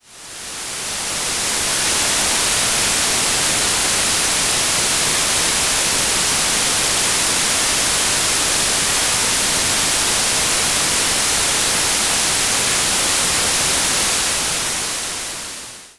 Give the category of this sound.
Music > Multiple instruments